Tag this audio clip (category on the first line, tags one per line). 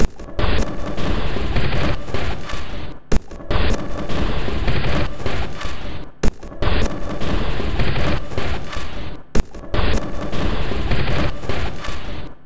Instrument samples > Percussion
Underground
Ambient
Soundtrack
Packs
Dark